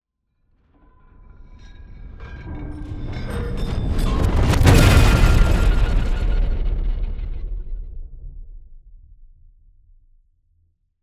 Sound effects > Other
Riser Hit sfx 121
Riser Hit powerful ,cinematic sound design elements, perfect for trailers, transitions, and dramatic moments. Effects recorded from the field. Recording gear-Tascam Portacapture x8 and Microphone - RØDE NTG5 Native Instruments Kontakt 8 REAPER DAW - audio processing
bass, boom, cinematic, deep, epic, explosion, game, hit, impact, implosion, industrial, movement, riser, stinger, sub, sweep, tension, thud, trailer, transition, whoosh